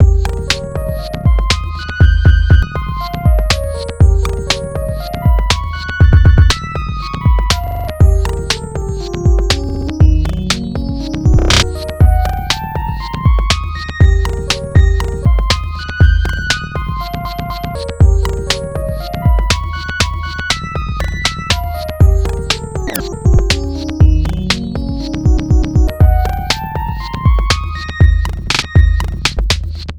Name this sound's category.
Music > Multiple instruments